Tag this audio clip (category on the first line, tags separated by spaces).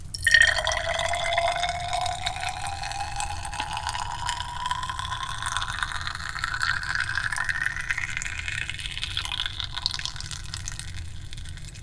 Sound effects > Objects / House appliances
bonk clunk drill fieldrecording foley foundobject fx glass hit industrial mechanical metal natural object oneshot perc percussion sfx stab